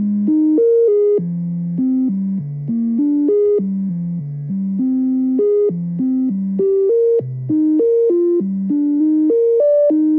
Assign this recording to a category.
Soundscapes > Synthetic / Artificial